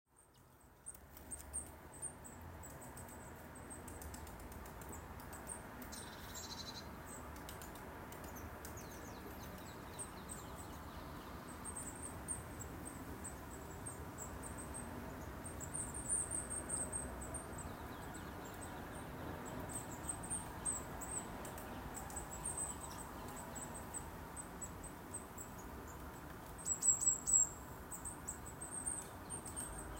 Sound effects > Natural elements and explosions
Birds, Cars, Road Atmos
Recording from a window with birds calling and cars passing by in the distance.